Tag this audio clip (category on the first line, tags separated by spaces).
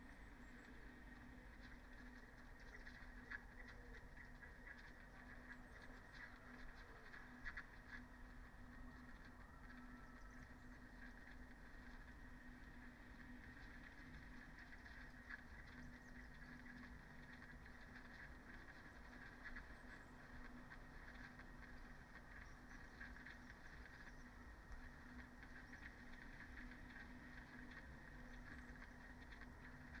Soundscapes > Nature
soundscape Dendrophone field-recording sound-installation natural-soundscape raspberry-pi artistic-intervention phenological-recording nature weather-data alice-holt-forest modified-soundscape data-to-sound